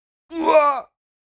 Speech > Other
Character Death 2
I made to use for RPG Game in mind. Could be used for anything else you can think of though. Made by R&B Sound Bites if you ever feel like crediting me ever for any of my sounds you use. Good to use for Indie game making or movie making. This will help me know what you like and what to work on. Get Creative!
Character, Death, RPG